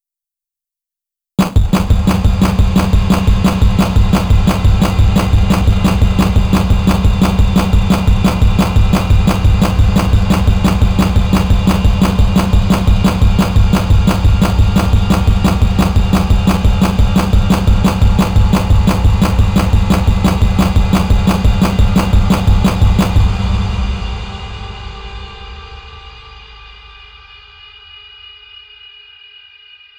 Music > Solo percussion
Simple Bass Drum and Snare Pattern with Weirdness Added 045
FX-Drums, Simple-Drum-Pattern, Interesting-Results, Bass-Drum, FX-Drum-Pattern, Experiments-on-Drum-Patterns, Glitchy, FX-Laden-Simple-Drum-Pattern, Noisy, Four-Over-Four-Pattern, Silly, FX-Laden, Experimental-Production, Experiments-on-Drum-Beats, Bass-and-Snare, Fun, Experimental, FX-Drum, Snare-Drum